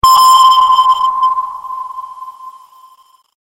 Sound effects > Electronic / Design
Loud Jumpscare 5
Audio, Jumpscare, Loud